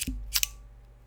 Sound effects > Other
LIGHTER.FLICK.8
zippo, Lighter, Flicks